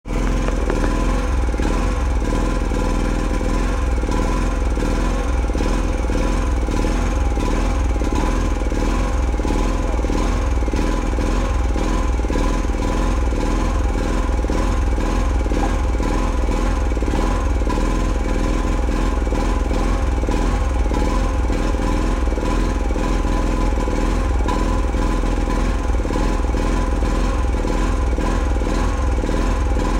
Sound effects > Other mechanisms, engines, machines
Xe Lu Nhỏ - Small Construction Machine
Small construction machine. Record use iPhone 7 Plus smart phone 2025.02.16 08:22